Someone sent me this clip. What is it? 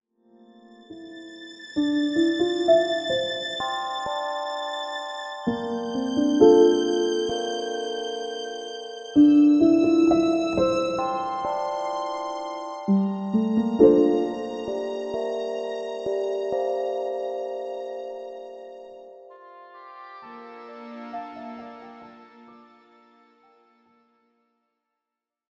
Music > Multiple instruments
Bittersweet Past (Music Sample)
nostalgic-piano, tragic-instrumental-theme, bittersweet-cinematic-music, sad-cinematic-theme, bittersweet-instrumental-theme, sentimental-piano-theme, bittersweet-instrumental-music, bittersweet-instrumental-piece, bittersweet-music, sad-instrumental-theme, bittersweet-cinematic-theme, nostalgic-piano-music, sad-movie-music, tragic-piano-theme, sad-instrumental-music, bittersweet-strings, bittersweet-string-music, sentimental-piano, tragic-piano, sad-movie-theme, sentimental-instrumental, tragic-cinematic-music, sad-piano, nostalgic-piano-theme, sad-piano-theme, sad-orchestral-music, sad-orchestral-piece